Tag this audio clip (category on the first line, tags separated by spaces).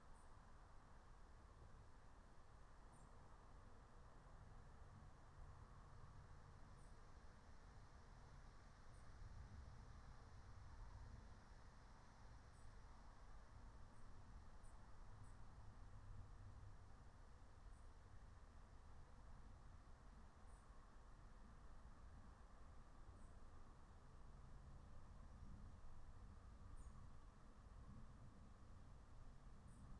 Soundscapes > Nature
natural-soundscape nature alice-holt-forest soundscape meadow phenological-recording raspberry-pi field-recording